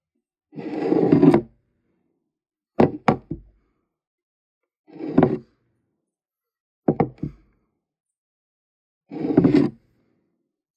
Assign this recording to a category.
Sound effects > Objects / House appliances